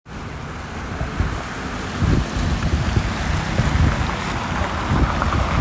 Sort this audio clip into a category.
Sound effects > Vehicles